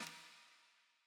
Music > Solo percussion
Snare Processed - Oneshot 67 - 14 by 6.5 inch Brass Ludwig
flam, percussion, snares, rimshot, reverb, ludwig, fx, realdrums, rimshots, brass, hit, kit, hits, drumkit, rim, crack, roll, perc, snare, oneshot, realdrum, drum, snaredrum, snareroll, beat, sfx, acoustic, processed, drums